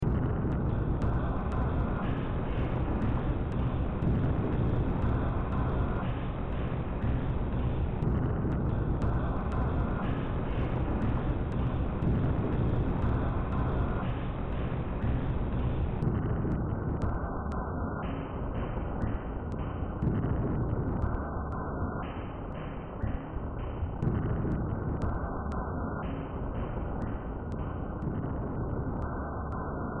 Music > Multiple instruments
Demo Track #3617 (Industraumatic)
Ambient, Cyberpunk, Games, Horror, Industrial, Noise, Sci-fi, Soundtrack, Underground